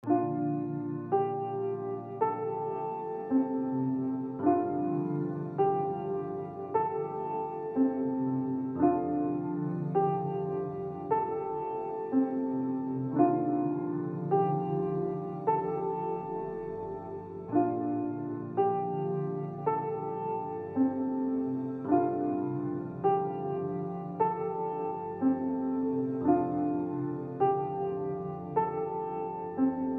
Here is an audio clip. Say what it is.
Music > Solo instrument
Memories - Nostalgic Simple Piano Melody
A nostalgic piano, made with FL STUDIO, Quiet Piano 2. Key: A♯ Major - 60 bpm
calm, piano